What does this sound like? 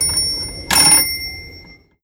Sound effects > Objects / House appliances
TOYMech-Samsung Galaxy Smartphone, CU Chatter Telephone, Rotary Dial, Bells Nicholas Judy TDC
A chatter telephone rotary dial with bells. Recorded at The Arc.
bells, chatter-telephone, Phone-recording, rotary-dial